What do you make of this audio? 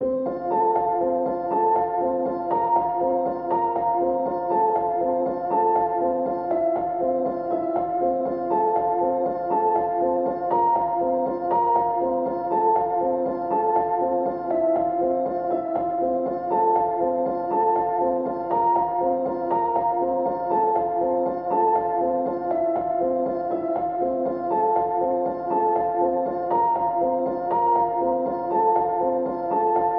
Music > Solo instrument
Piano loops 078 efect 4 octave long loop 120 bpm
samples, 120, free, pianomusic, 120bpm, reverb, loop, piano, music, simplesamples, simple